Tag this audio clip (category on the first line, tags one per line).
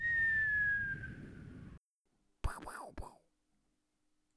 Sound effects > Other
down
Selfmade
fall
falling
falldown